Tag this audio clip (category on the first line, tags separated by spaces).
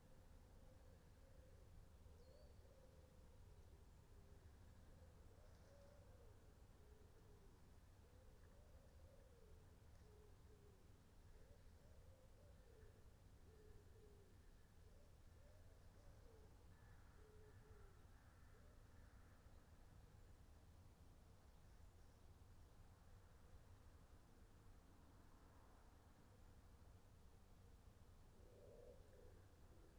Soundscapes > Nature
alice-holt-forest artistic-intervention